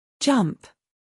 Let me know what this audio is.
Speech > Solo speech
to jump
word, voice, english, pronunciation